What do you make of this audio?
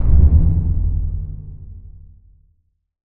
Sound effects > Electronic / Design
RESONANT RUMBLY GRAND BOOM
BASSY, BOOM, DEEP, DIFFERENT, EXPERIMENTAL, EXPLOSION, HIPHOP, HIT, IMPACT, INNOVATIVE, LOW, RAP, RATTLING, RUMBLING, TRAP, UNIQUE